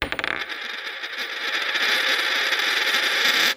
Sound effects > Objects / House appliances
OBJCoin-Samsung Galaxy Smartphone, CU Quarter, Drop, Spin 04 Nicholas Judy TDC
A quarter dropping and spinning.
drop, foley, Phone-recording, quarter, spin